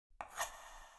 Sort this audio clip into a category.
Sound effects > Other